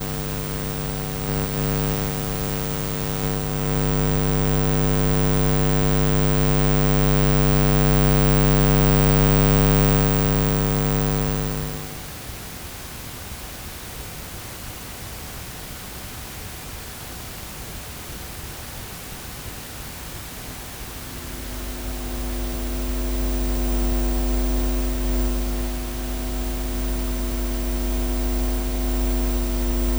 Soundscapes > Other
Irregular Electronic Hum
Induction loop recording of electrical appliance. Recorded on zoom h2n.
buzzing, drone, electronic-hum, hum, humming, induction-loop, noise